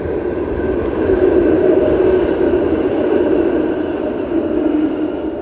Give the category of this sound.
Soundscapes > Urban